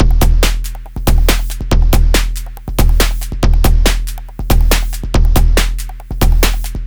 Music > Other
microtonic 2 + 140 bpm
FL studio 9 + vst microtonic
bass, beat, drum, drumbeat, drumloop, dur, IDM, loop, techno